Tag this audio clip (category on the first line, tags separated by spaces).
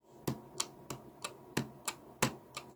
Sound effects > Objects / House appliances
equipment tap mouse office soundfx